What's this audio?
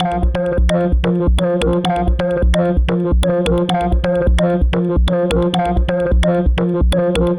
Music > Solo instrument
130bpm BassTranceLoop F-4
It's just a bassloop recorded at F-4 at 130bpm for your creative productions, enjoy.
dance, 130, 130bpm, loop, trance, bassline, bass, club, bpm, hard, line